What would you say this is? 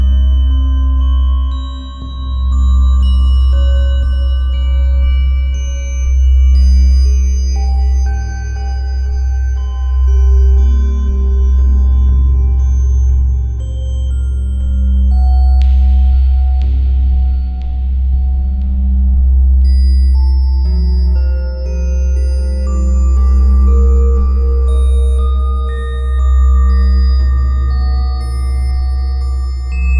Soundscapes > Synthetic / Artificial
Pulsating Drone with C Dorian Bells and Bass Tone Soundscape Background
A background drone which is slightly pulsating, therefore creating an atmosphere of waiting and tension. Bells are inserted on top along with some glitches. Notes are in C Dorian. Created with VCV rack
background, drone, C-dorian, atmos, bells, Bell, soundscape, background-sound, atmosphere